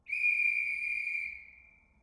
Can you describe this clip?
Sound effects > Objects / House appliances
WHSTMech-Blue Snowball Microphone Whistle, Pea, Blow, Distant Nicholas Judy TDC
A pea whistle blowing in the distance.
blow, Blue-brand, Blue-Snowball, distance, pea, whistle